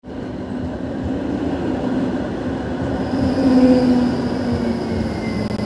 Soundscapes > Urban
Sound of tram moving near a stop in Tampere. Recorded with Apple iPhone 15.